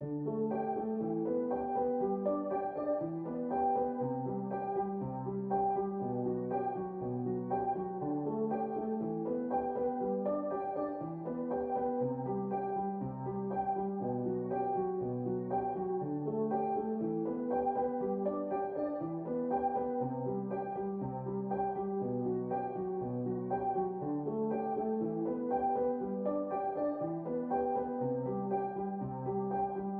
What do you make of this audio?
Music > Solo instrument
Piano loops 184 efect octave long loop 120 bpm
simple, reverb, loop, 120bpm, piano, free, 120, music, pianomusic, simplesamples, samples